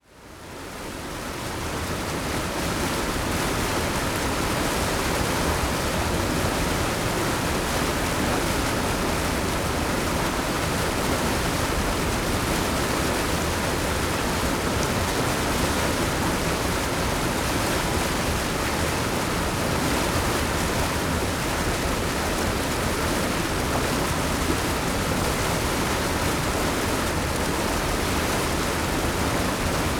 Soundscapes > Urban
A recording of fast flowing water passing through an outlet into a pond.
fast, field, flow, outlet, recording, water